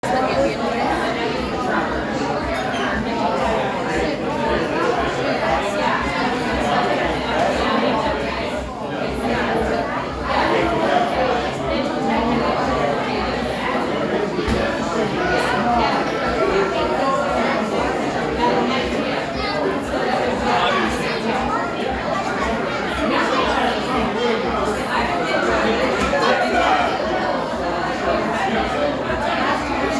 Sound effects > Human sounds and actions
Family Restaurant/Bar/Grill - Chatter/Ambience
Kids and adults socializing and chatting in a busy local pizza shop.
ambiance, ambience, ambient, bar, chatter, children, crowd, field-recording, people, restaurant, talking, tavern, voices